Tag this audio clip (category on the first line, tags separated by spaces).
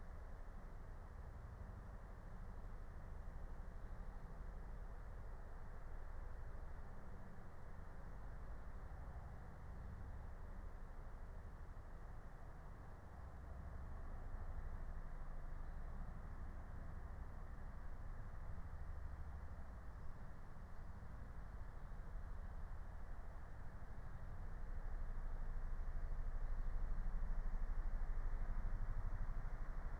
Soundscapes > Nature
raspberry-pi phenological-recording alice-holt-forest natural-soundscape soundscape meadow field-recording nature